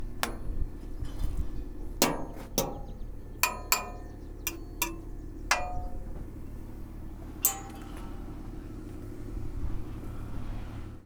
Sound effects > Objects / House appliances
Junkyard Foley and FX Percs (Metal, Clanks, Scrapes, Bangs, Scrap, and Machines) 172
Junkyard, waste, Metallic, Clang, dumpster, trash, Clank, rattle, Percussion, Junk, Foley, Smash, Bang, Ambience, Dump, Robot, Robotic, SFX, Metal, FX, rubbish, tube, Environment, Perc, Atmosphere, Machine, scrape, Bash, garbage, dumping